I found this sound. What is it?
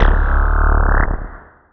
Instrument samples > Synths / Electronic
CVLT BASS 49
low, sub, drops, clear, bassdrop, synthbass, wobble, lfo, synth, lowend, subbass, wavetable, subwoofer, subs, stabs, bass